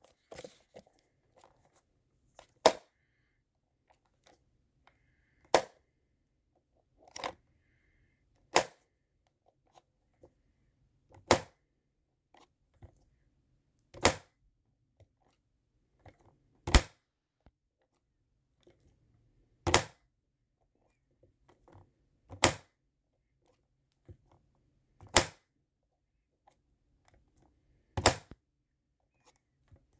Sound effects > Objects / House appliances
FOLYProp-Samsung Galaxy Smartphone Oticon SmartCharger Case, Open, Close Nicholas Judy TDC
An Oticon SmartCharger case opening and closing.
case, Phone-recording, smartcharger, close, foley, open